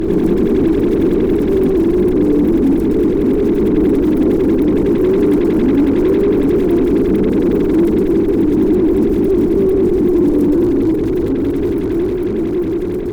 Sound effects > Electronic / Design
Horror Drone Atmosphere
An experimental Horror atmos, tried to create a drone but I'm pretty sure I failed, designed with Pigments via studio One
Ambient, Drone, Horror